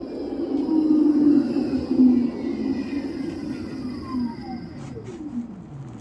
Soundscapes > Urban
final tram 35
tram, hervanta, finland